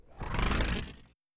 Sound effects > Experimental
Stack going up
Created for the video game DystOcean, I made all sounds with my mouth + mixing.
bright, count, increase, Stack, voice